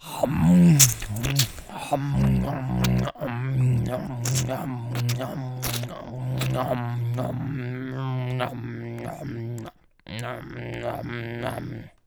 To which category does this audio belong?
Sound effects > Human sounds and actions